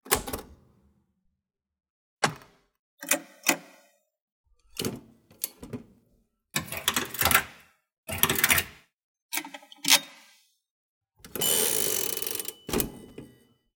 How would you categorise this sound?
Sound effects > Other mechanisms, engines, machines